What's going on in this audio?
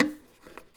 Sound effects > Other mechanisms, engines, machines
Handsaw Oneshot Metal Foley 19
sfx, twang, fx, foley, percussion